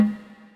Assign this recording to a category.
Music > Solo percussion